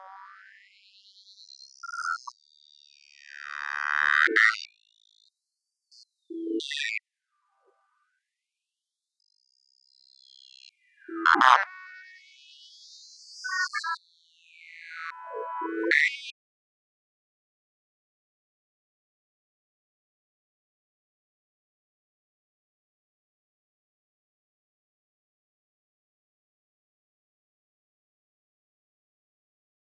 Music > Solo instrument
Exporting the 'Underwater Piano Reverse Chords' file, I drastically altered the formant and vibrato via Flex Pitch.